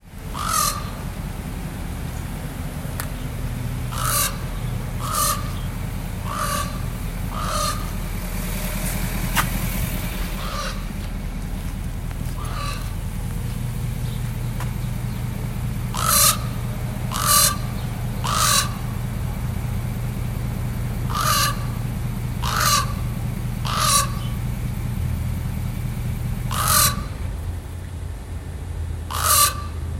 Soundscapes > Nature
Raven on Main Street
A loquacious raven perched on the bed of an idling pick-up truck parked at the corner of Main and Front Streets in Whitehorse, Yukon. Recorded in mono on an iPhone Xr on October 1, 2025.